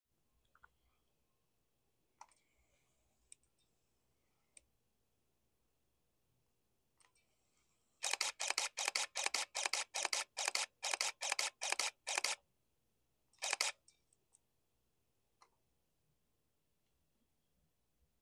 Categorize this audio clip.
Sound effects > Electronic / Design